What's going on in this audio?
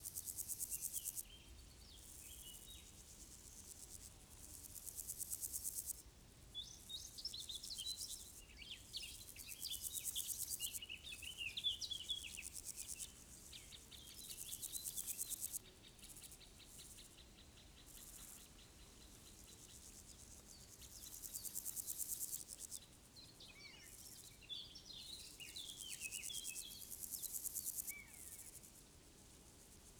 Soundscapes > Nature
Morvan Grillons juin25 2
Quiet summer forest ambiance near Morvan, Bourgogne, France. Close recording of cicadas / crickets and some birds : blackcap, eurasian linnet, chiffchaff. Some light wind and a few bees. 2 x EM272 mics in a kind of AB stereo July 2025
insects, crickets, nature, birds, grillons, field-recording, morvan, orthoptera, summer, forest, cicadas